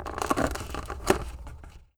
Sound effects > Objects / House appliances
OBJCont-Blue Snowball Microphone, CU Tray, Plastic, Open Nicholas Judy TDC
A plastic tray opening.